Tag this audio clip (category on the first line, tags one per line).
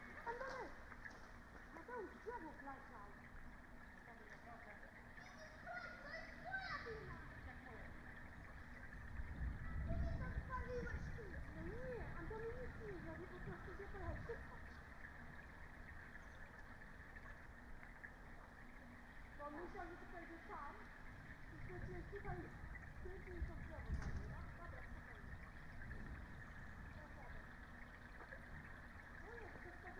Soundscapes > Nature
weather-data
field-recording
modified-soundscape
Dendrophone
nature
data-to-sound
natural-soundscape
raspberry-pi
alice-holt-forest
artistic-intervention
soundscape
phenological-recording
sound-installation